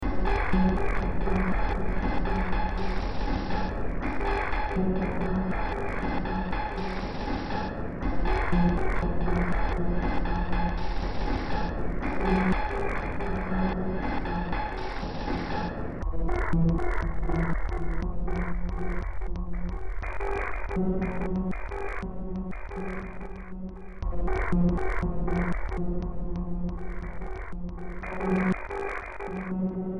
Music > Multiple instruments
Demo Track #3732 (Industraumatic)
Horror, Industrial, Ambient, Underground, Cyberpunk, Games, Sci-fi, Soundtrack, Noise